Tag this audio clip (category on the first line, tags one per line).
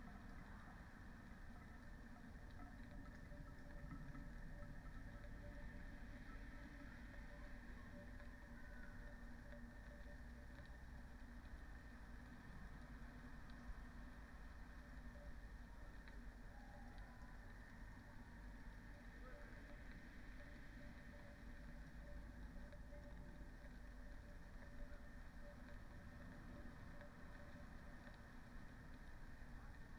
Soundscapes > Nature
data-to-sound
field-recording
weather-data